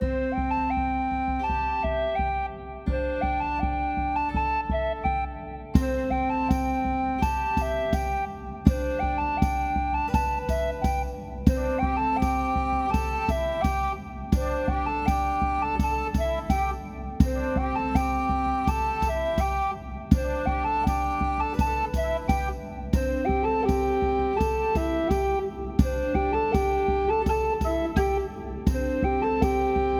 Music > Other
BGM for Celtic battle. Created with Cubase Pro 14.